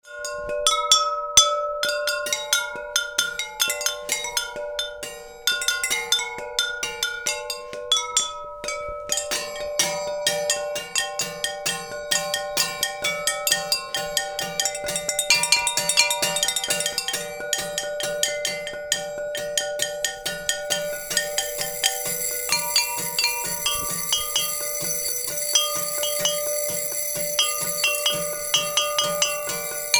Instrument samples > Percussion
Batucada con ceramica Pando Montevideo
Embarrados was a community group from Pando, Uruguay, focused on culture and social change. They organized workshops, music events, and urban gardening to strengthen local ties. Their name meant "dirty with clay" reflecting their hands-on community work. They are ceramics made of clay and built by themselves.